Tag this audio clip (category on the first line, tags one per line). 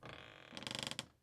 Sound effects > Objects / House appliances
creak
creaking
door
foley
horror
old-door
wooden